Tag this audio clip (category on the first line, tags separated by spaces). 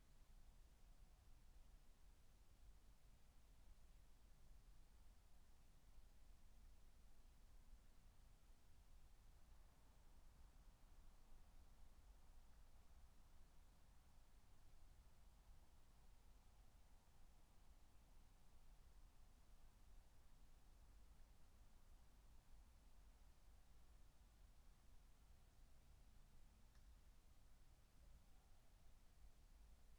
Soundscapes > Nature
alice-holt-forest artistic-intervention Dendrophone phenological-recording sound-installation weather-data